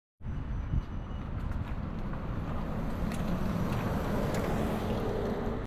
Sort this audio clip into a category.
Sound effects > Vehicles